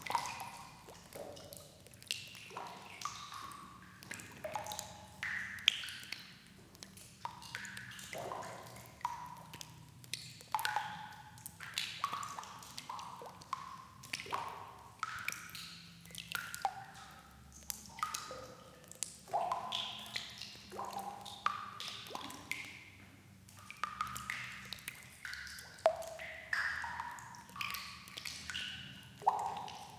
Soundscapes > Indoors
This is a recording of dripping into a munitions store in the abandoned WWII Italian military installation on Mont Chaberton in the French Alps (the border moved after the war). The location is on the summit at 3,131 m and there is still snow and ice in the interiors, even in July when this was recorded. The combination of snow, ice, flooded interiors and simply getting to the location made this a difficult recording. Recording was made with a pair of Primo EM272s into a Zoom F3.